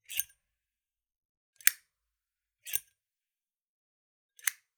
Sound effects > Objects / House appliances
Zippo Lighter Opening and Closing
Exactly as described. Recorded using an Audio-Technica AT2020USB+.
lighter, smoking, zippo